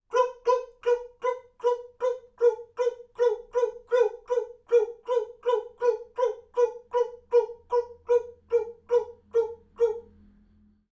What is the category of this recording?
Sound effects > Human sounds and actions